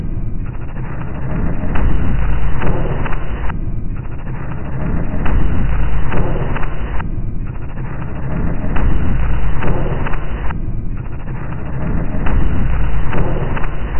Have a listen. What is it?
Instrument samples > Percussion
This 137bpm Drum Loop is good for composing Industrial/Electronic/Ambient songs or using as soundtrack to a sci-fi/suspense/horror indie game or short film.
Ambient, Packs, Alien, Loop, Soundtrack, Samples, Loopable, Underground, Drum, Industrial, Dark, Weird